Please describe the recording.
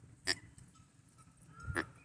Sound effects > Animals
Antelope - Blackbuck, Two Snorts
Close up, a blackbuck antelope grunts. This antelope from south Asia (not Africa) was recorded using an LG Stylus 2022 at Hope Ranch Zoo.
animal, antelope, asia, asian, blackbuck, exotic, india, safari